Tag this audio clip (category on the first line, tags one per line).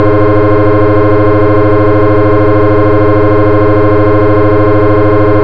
Sound effects > Other mechanisms, engines, machines
train
motor
mover
railway
2-stroke
rail
railroad
v16
locomotive
prime
567
diesel
engine
freight
emd
notch